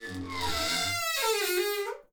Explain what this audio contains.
Sound effects > Objects / House appliances
Authentic heavy wooden door creak recorded in an old apartment, perfect for vintage/horror atmosphere. This is a demo from the full "Apartment Foley Sound Pack Vol. 1",which contains 60 core sounds and over 300 variations. Perfect for any project genre.
creak, wooden, horror, door, vintage